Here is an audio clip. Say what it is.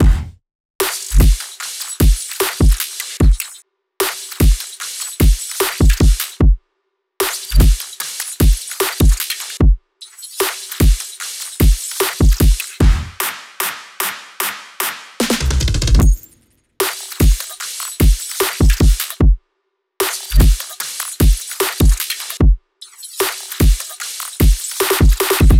Instrument samples > Percussion
FILTH Drum loop 150BPM
Made and mixed in GarageBand
drum, loop